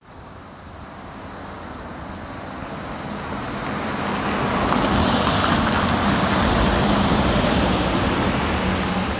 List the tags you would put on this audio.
Soundscapes > Urban
bus
transportation
vehicle

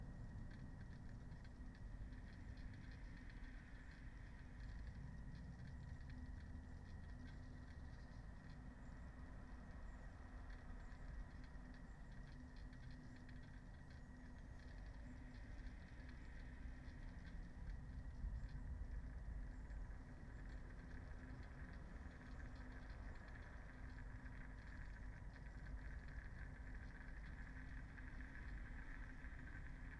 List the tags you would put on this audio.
Soundscapes > Nature
alice-holt-forest
field-recording

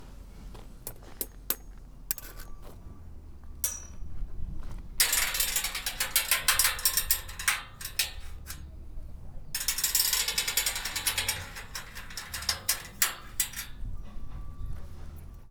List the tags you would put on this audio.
Sound effects > Objects / House appliances
Atmosphere
Bash
dumping
FX
Junkyard
Machine
Metallic
Smash
tube
waste

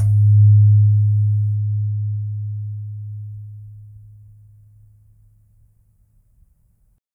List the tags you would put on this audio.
Sound effects > Objects / House appliances
metal
resonance
lock
oven

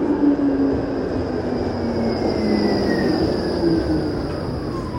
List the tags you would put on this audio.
Sound effects > Vehicles
city
Tram
urban

